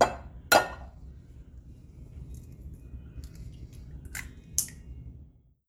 Sound effects > Objects / House appliances
FOODCook-Samsung Galaxy Smartphone Egg, Crack, Into Bowl Nicholas Judy TDC
Egg cracking into bowl.
bowl, crack, egg, foley, Phone-recording